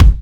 Instrument samples > Percussion
kick Tama Silverstar Mirage 22x16 inch 2010s acryl mild bassdrum beater mild mallet striker 7
A kick with a mild bassdrum/kick beater/striker/mallet/hammer.
attack, bass, bass-beater, bass-drum, bassdrum, bass-hammer, bass-mallet, bass-striker, drum, fat-drum, fatdrum, fat-kick, fatkick, forcekick, groovy, kick, kick-beater, kick-mallet, kick-striker, mainkick, metal, percussion, rhythm, rock, thrash, thrash-metal, trigger, wood